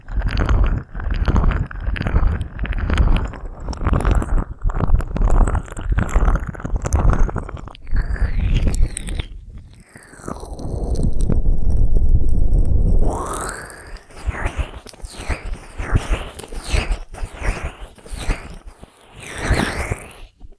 Sound effects > Experimental
ASMR FX 415
A squishy visceral sequence of asmr sounds, biologically made, using rubber, and silicone and flesh with liquid, and contact mics as well as the ol sm57a Beta. processed minimally with Reaper
glitch, soundeffect, noise, effect, asmr, organic, alien, future, soothing, sounddesign, sfx, experimental, creature, monster, freaky, cerebral, mouth, visceral, abstract, biological, strange, sci-fi, wtf, contact, otherworldly, weird, slurp, ambiant, fx, sound-design